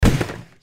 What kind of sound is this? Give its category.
Sound effects > Natural elements and explosions